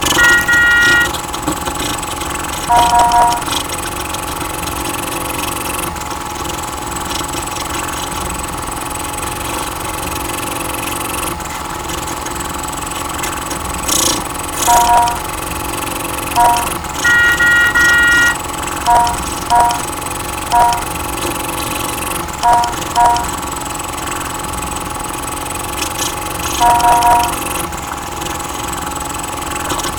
Sound effects > Vehicles
TOONVeh-CU Jalopy, Jerky, Sputters, Horns Nicholas Judy TDC
A jerky jalopy with sputters and horns.
Blue-brand, Blue-Snowball, horn, jalopy, jerky, sputter